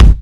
Instrument samples > Percussion
kick Tama Silverstar Mirage 22x16 inch 2010s acryl bassdrum - kickdist 2
jazz-drum, headwave, thrash-metal, hit, percussion, rock, bass-drum, pop, groovy, death-metal, percussive, bass, non-electronic, kick, attack, jazzdrum, beat, thrash, bassdrum, trigger, metal, jazzkick, rhythm, jazz-kick, headsound, drum, non-overtriggered, drums, natural, mainkick